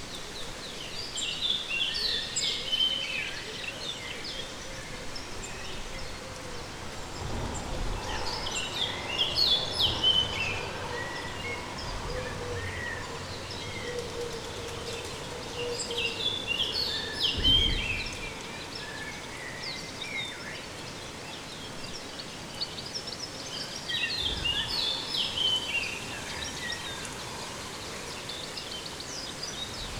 Soundscapes > Nature
Birds rain azores
field-recording, birds, nature